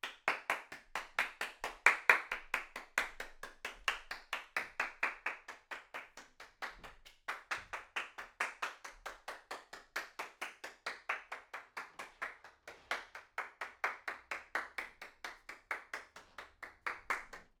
Sound effects > Human sounds and actions
Applause walking around room 1
AV2, person, indoor, XY, Tascam, Applause, Rode, individual, Solo-crowd, Applauding, NT5, solo, FR-AV2, clap, Applaud, clapping